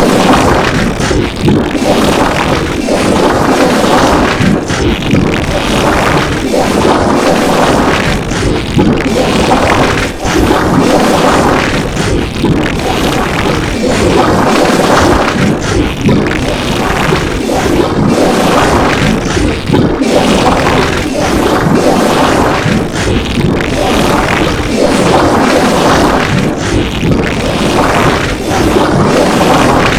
Sound effects > Human sounds and actions
• I selected many burps and farts and I improved the quality of the sound via WaveLab 11's restoration. • I created an enhanced stereo experience by merging one stereo channel with one channel delay, one slightly different only-left audio file and one slightly different only-right audio file • I created one copy 4.5 semitones low-pitched and one copy 6.5 semitones low-pitched. • I used different AutoPan settings per channel in the mergedown. I improved and enriched the file in many mixdowns and I blended variations. It's a typical cesspit death metal intro/outro. ______________________________________________ Please mix diarrhea sounds with knife sounds to create a realistic evisceration sounds.